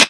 Instrument samples > Percussion
hi-hatized crash Sabian low-pitched 1 long
closed-hat, snappy-hats, crisp, metallic, click, picocymbal, metal, percussion, Istanbul, Meinl, chick-cymbals, Zildjian, dark-crisp, cymbal-pedal, bronze